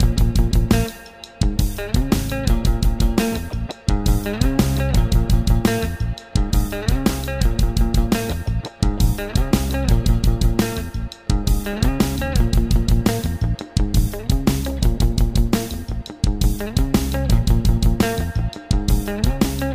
Music > Multiple instruments
A lil riff idea I recorded in my studio using bass and guitar and programmed drums.